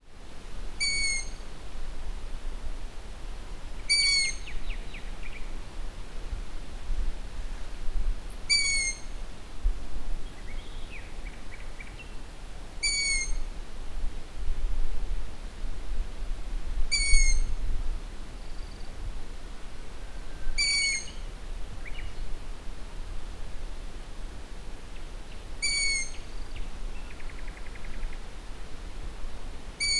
Soundscapes > Nature
Night soundscape in a grove in the Po Valley pt2
Soundscape recorded outdoors in a small woodland in the Po Valley lowlands, around 1:00 AM. The recording was made using a ZOOM H1n handheld recorder with a windscreen. Unfortunately, since the microphone wasn't mounted on a stand, some handling noise is present. Despite this, at least five different animals can be clearly heard, including:
numerous begging calls from a Long-eared Owl (Asio otus);
the song of a Common Nightingale (Luscinia megarhynchos);
occasional nocturnal calls from a Common Moorhen (Gallinula chloropus);
a bat;
a cricket.
nature, night, Nightingale, grove, field-recording, outdoor, ambience, cricket, owl, bat